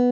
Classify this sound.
Instrument samples > String